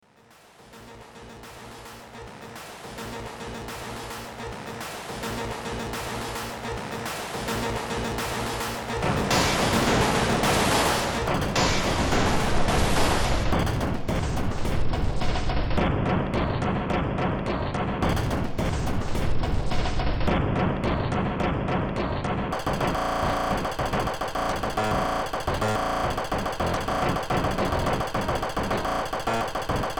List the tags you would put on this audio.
Music > Multiple instruments
Soundtrack Underground

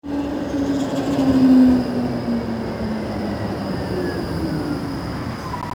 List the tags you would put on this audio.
Soundscapes > Urban
streetcar tram transport

